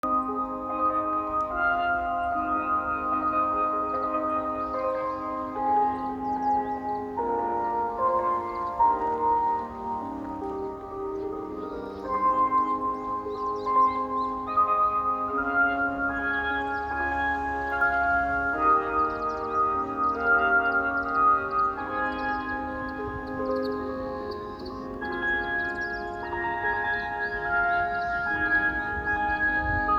Music > Solo instrument
Hatoyama Chime 4:55pm
Chime in Hatoyama, Japan which plays everyday to let any children outside know it is time to go home.
Asia, chiming, Japan, Japanese